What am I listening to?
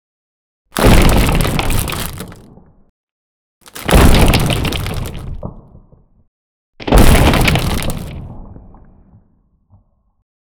Sound effects > Natural elements and explosions
rock impact w cracking sound heavens feel 01012026
sounds of custom rock explosion cracking ground sounds. Can be used for characters superhero landing or preparing to fly.
anime, explode, huge